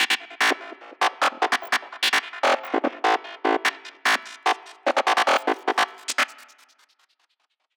Sound effects > Electronic / Design
A tight and focused FM synth line, built for high-energy psytrance production. Sharp transients, metallic overtones, and subtle pitch modulation give it a precise yet aggressive character. Perfect for hitech, nightpsy, or forest leads — cuts clean through dense mixes and responds well to further processing or resampling. 147 BPM – E major – heavily distorted, so results may vary!
effect, electro, fm, fx, loop, psyhedelic, psytrance, sfx, synt, synth, trance